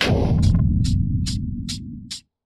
Sound effects > Electronic / Design
Impact Percs with Bass and fx-008
smash, mulit, crunch, low, percussion, bass, ominous, cinamatic, oneshot, impact, fx, brooding, combination, hit, deep, foreboding, theatrical, bash, explosion, sfx, explode, perc, looming